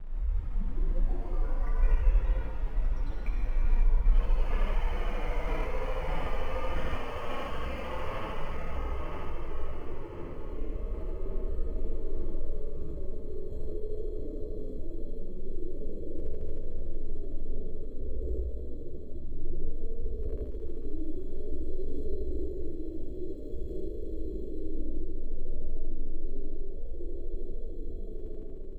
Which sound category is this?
Sound effects > Electronic / Design